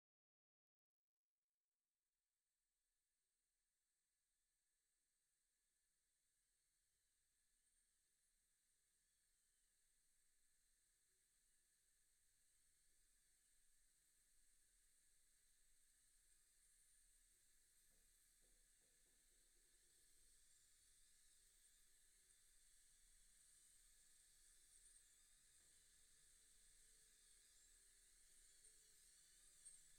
Sound effects > Objects / House appliances
kettle non whistling Pava sin silbido.
A sound of my kettle which has no whistling device. Recorded with DoblyOn in a phone, Moto g75. Ésta es mi pava, de las que no chifla. Grabado con DoblyOn en un teléfono, Moto g75.
kitchen, water, boil, foley, boiling, kettle